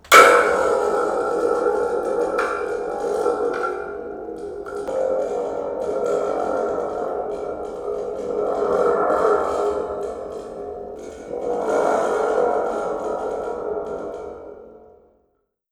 Music > Solo percussion
MUSCPerc-Blue Snowball Microphone, CU Thunder Tube, Boom, Rumble Nicholas Judy TDC
Thunder tube boom and rumble.